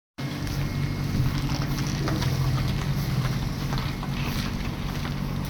Soundscapes > Urban
car sound 2
Car, passing, studded, tires